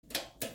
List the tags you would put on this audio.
Sound effects > Objects / House appliances
light press short

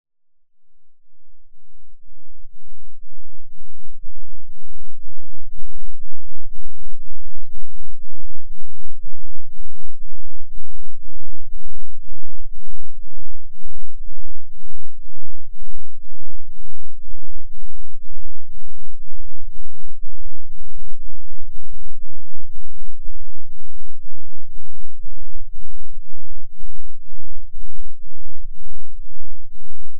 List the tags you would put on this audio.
Sound effects > Experimental
1hz Experimental Infranoise infrasonic Low noise Rumble sub-bass wobbly

